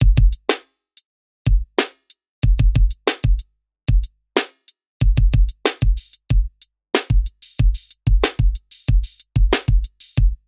Music > Solo percussion
93bpm - Beat Drum AIO - Remastered
Fresh and Grounded Beat. Good vibes.